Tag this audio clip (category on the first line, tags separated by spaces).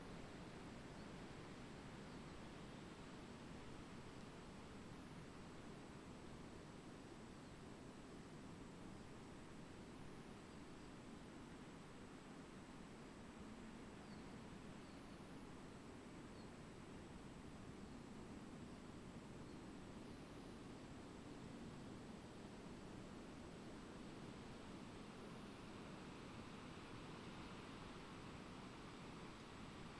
Soundscapes > Nature
modified-soundscape weather-data Dendrophone artistic-intervention data-to-sound nature phenological-recording soundscape field-recording sound-installation raspberry-pi natural-soundscape alice-holt-forest